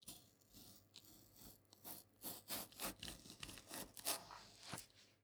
Sound effects > Other
Long slice vegetable 9
Potato being slowly sliced with a Santoku knife in a small kitchen.
Chief, Knife